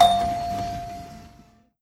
Sound effects > Objects / House appliances
A department store paging bell or a doorbell sample. Recorded at Five Below.